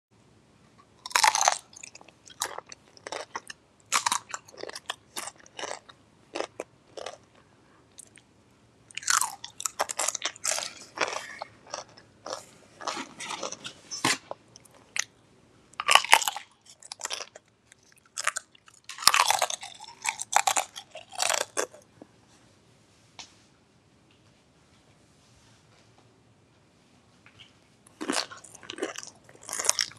Sound effects > Human sounds and actions
Eating tortilla chips
The noise here was recorded December 31, 2025 at 7:40 AM. I found some tortilla chips and I decided to eat them, then I thought “Hey, I think this would make a good sound effect!”
Human, Chips, Eating